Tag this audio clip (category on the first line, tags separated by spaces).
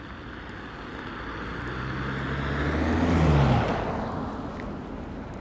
Soundscapes > Urban
car tampere vehicle